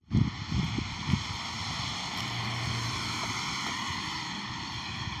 Sound effects > Vehicles
car passing 20
car, drive, vehicle